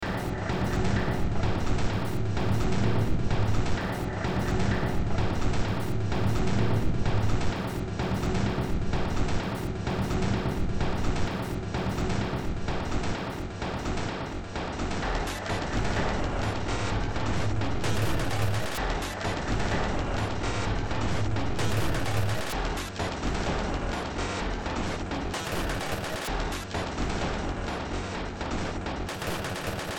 Music > Multiple instruments
Demo Track #3207 (Industraumatic)
Ambient, Cyberpunk, Games, Horror, Industrial, Noise, Sci-fi, Soundtrack, Underground